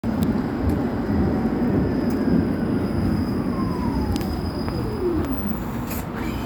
Sound effects > Vehicles
A tram is passing by, slowing down speed to a stop. Recorded in Tampere with a samsung phone.